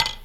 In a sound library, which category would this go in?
Sound effects > Other mechanisms, engines, machines